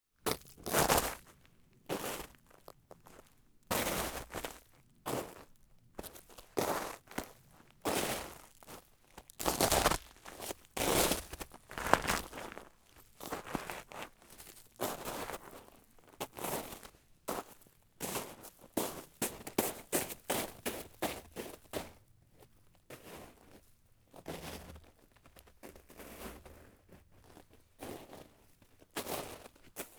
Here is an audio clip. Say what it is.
Soundscapes > Nature
Footsteps in a frozen snow various speed recorded with zoom H5